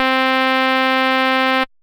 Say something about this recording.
Instrument samples > Synths / Electronic
03. FM-X ALL2 SKIRT7 C3root
MODX, Yamaha, Montage, FM-X